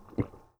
Sound effects > Human sounds and actions
HMNMisc-Blue Snowball Microphone Swallow, Liquid Nicholas Judy TDC
A liquid swallow.
Blue-brand, Blue-Snowball, gulp, liquid, swallow